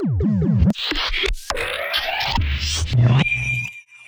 Sound effects > Experimental
Glitch Percs 92
alien, glitch, edm, idm, crack, hiphop, perc, whizz, impacts, experimental, clap, percussion, impact, abstract, zap, pop, sfx, otherworldy